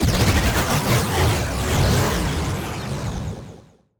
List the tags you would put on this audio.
Sound effects > Electronic / Design
sound-design evolving hit sfx

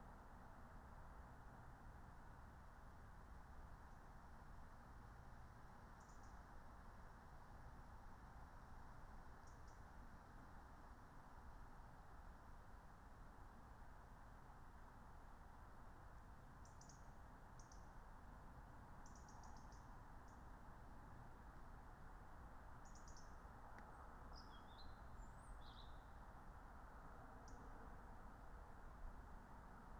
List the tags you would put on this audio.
Soundscapes > Nature
soundscape alice-holt-forest natural-soundscape

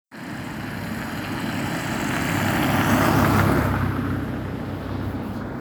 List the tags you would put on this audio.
Sound effects > Vehicles
asphalt-road car moderate-speed passing-by studded-tires wet-road

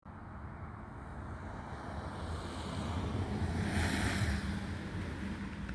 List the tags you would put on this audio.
Sound effects > Vehicles
car engine vehicle

